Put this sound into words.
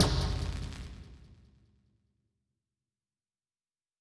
Sound effects > Electronic / Design
A sci-fi gunshot/laser sound. Made in Ableton.
pew, scifi, alien, gun, laser, shoot, space, gunshot, shot